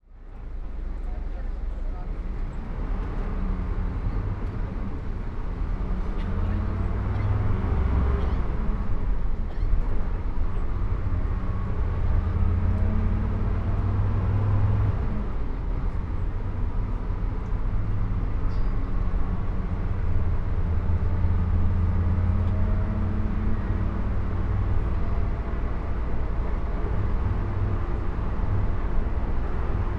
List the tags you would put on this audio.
Sound effects > Vehicles
doors
soundscape
field-recording
vehicle
Philippines
people
lurch
open
bump
bus
voices
trip
engine
road
motor
ambience
travel
jolt
transportation
close
atmosphere
noise

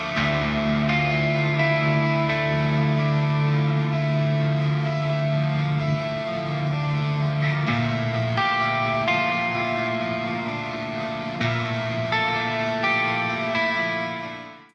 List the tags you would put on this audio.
Music > Other
BM
depressive
electric
guitar
sample

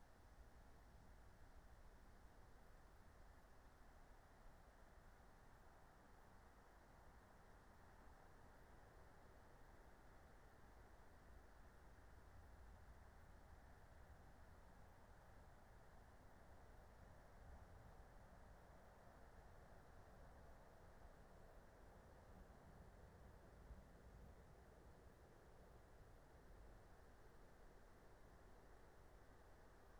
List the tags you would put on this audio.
Soundscapes > Nature
soundscape
nature
raspberry-pi
field-recording
alice-holt-forest

